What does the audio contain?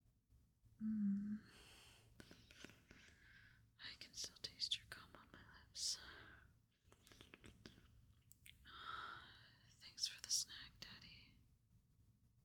Speech > Solo speech
I Can Taste You On My Lips
I can still taste your cum on my lips.
Female, Cum, Daddy, Sexy, Sub, Naughty